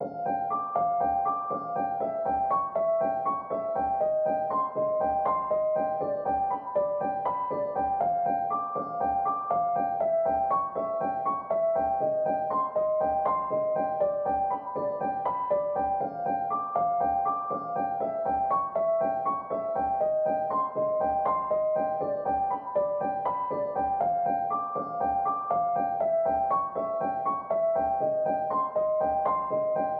Music > Solo instrument
Piano loops 201 octave up short loop 120 bpm
music, pianomusic, 120bpm, simple, samples, 120, loop, free, simplesamples, piano, reverb